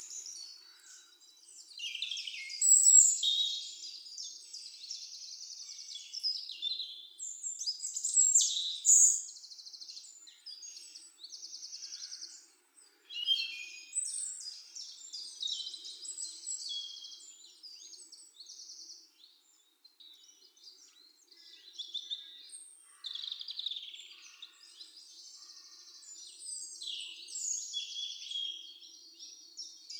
Soundscapes > Nature
Various Birds 1
A previous recording but edited using RX 11.
birds, field-recording, ambience, nature